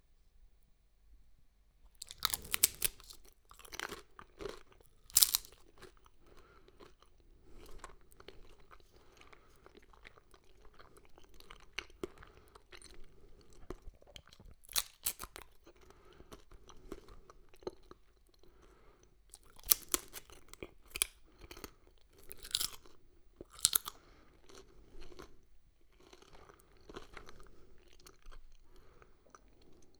Sound effects > Objects / House appliances
Crispy tortilla chewing
Originally I wanted to record sajtostallér chewing, but at home there was no sajtostallér. So I had to reproduce with crispy baked tortilla, for a film. Recorded with a Rode NT1 microphone on Steiberg audioitnerface.
eating, tortilla, chips, chewing, eat, crunchy, biting, snack, bite, sajtostaller, chew, crunch